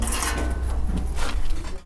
Sound effects > Objects / House appliances
Junkyard Foley and FX Percs (Metal, Clanks, Scrapes, Bangs, Scrap, and Machines) 28
Recording from the local Junkyard in Arcata, CA. Metallic bangs and clanks with machines running and some employees yelling in the distance. Garbage, Trash, dumping, and purposefully using various bits of metal to bop and clang eachother. Tubes, grates, bins, tanks etc.Recorded with my Tascam DR-05 Field Recorder and processed lightly with Reaper